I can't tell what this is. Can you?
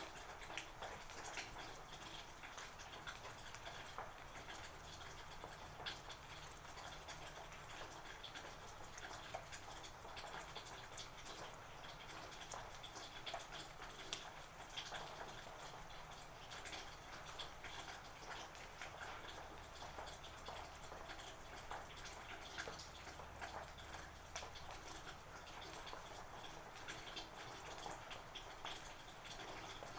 Soundscapes > Urban
Rain Outside of Window and Gutter
Rain recorded just outside of window, with both heavy and drizzling rain. Also starts with rain pouring from gutter.